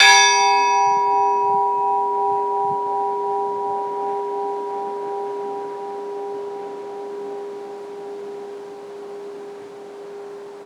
Instrument samples > Percussion
Orthodox bell. Recorded on the phone.